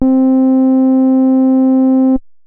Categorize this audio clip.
Instrument samples > Synths / Electronic